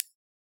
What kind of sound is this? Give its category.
Sound effects > Objects / House appliances